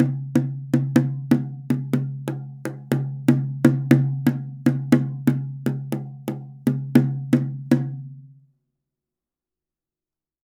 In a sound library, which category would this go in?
Music > Solo instrument